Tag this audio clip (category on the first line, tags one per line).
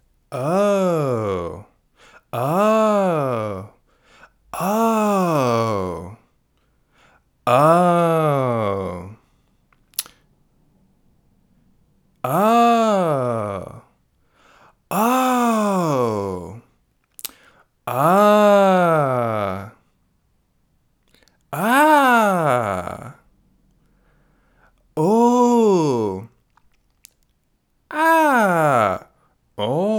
Speech > Solo speech
ahh
audience
crowd
oh
ooh